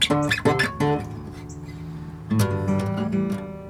Music > Solo instrument
Acoustic Guitar Oneshot Slice 54
acoustic guitar oneshot shorts, knocks, twangs, plucks, notes, chords recorded with sm57 through audiofuse interface, mastered with reaper using fab filter comp
note, foley, sfx, guitar, acoustic, notes, twang, oneshot, strings, string, pluck, knock, chord, fx, plucked